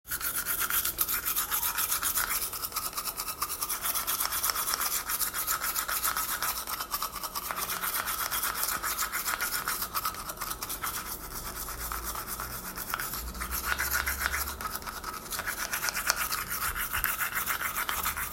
Sound effects > Human sounds and actions
Brushing teeth
A person brushing the teeth.
brush, teeth, tooth